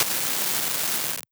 Instrument samples > Synths / Electronic
databent open hihat 2
A databent open hihat sound, altered using Notepad++